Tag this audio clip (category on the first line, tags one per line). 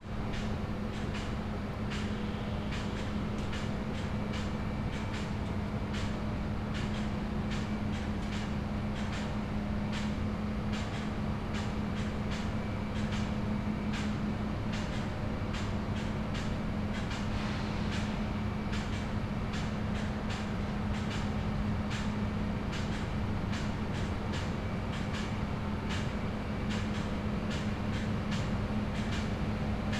Soundscapes > Urban
grinding
machine
sbahn
industrial
sparks
railway
soundscape
clang
night
metal
Berlin
train
slow
maintenance
ambient
rail